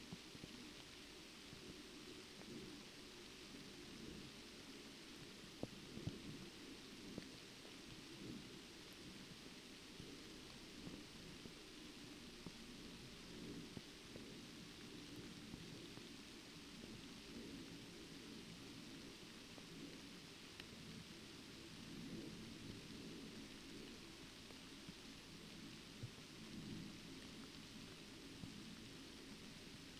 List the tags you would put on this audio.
Soundscapes > Nature
artistic-intervention nature weather-data Dendrophone

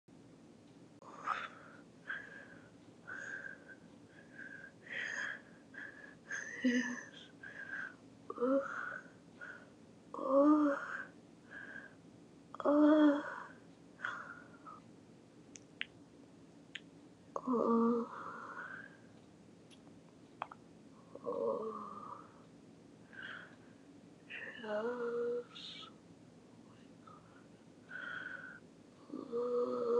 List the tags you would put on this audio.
Speech > Processed / Synthetic
dick fucking groan groaning moaning orgasm Sex sucking vagina

